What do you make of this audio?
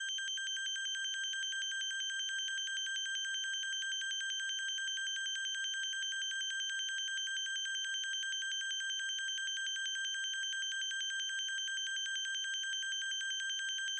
Sound effects > Electronic / Design
Shields At Critical Alarm (Loop) 2
Another high-pitched alert sound you might hear when a ship in a video game is critically damaged and won't survive another laser blast. It was produced for the 2025 GMTK Gamejam for a space shooter game called "F.L.O.P".
computer-alert, emergency-alarm, emergency-alarm-loop, low-shields-alarm, alert, critical-failure-sound, low-hp-alarm, computer-siren, perimeter-breach, damage-sustain-alert, heavy-damage-alert, low-hp-sound, low-health-sound, alert-sound, critical-damage-alarm, dylan-kelk, warning-klaxxon, intense-computer-alert